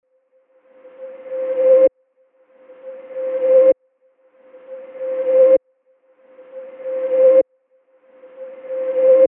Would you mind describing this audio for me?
Sound effects > Experimental
Ableton Live. VST.....impOSCar...Reverse 130 bpm Free Music Slap House Dance EDM Loop Electro Clap Drums Kick Drum Snare Bass Dance Club Psytrance Drumroll Trance Sample .
Snare, Kick, Drum, 130, Dance, Free, House, Slap, EDM, Electro, Drums, bpm, Bass, Loop, Music, Clap, Reverse